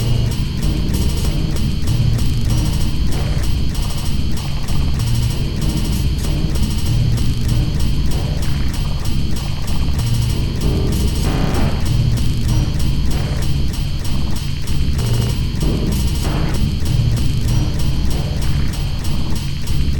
Instrument samples > Percussion
This 96bpm Drum Loop is good for composing Industrial/Electronic/Ambient songs or using as soundtrack to a sci-fi/suspense/horror indie game or short film.
Loopable, Weird, Samples, Soundtrack, Packs, Drum, Underground, Loop, Dark, Alien, Ambient, Industrial